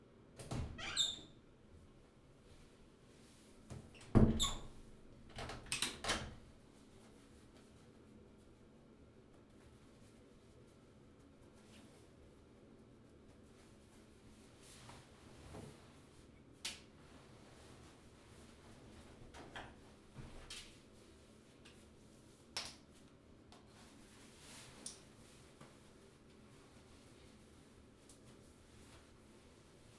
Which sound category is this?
Sound effects > Human sounds and actions